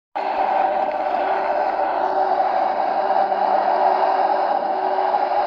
Sound effects > Vehicles
tram driving by1
Sound of a a tram drive by in Hervanta in December. Captured with the built-in microphone of the OnePlus Nord 4.
field-recording, tram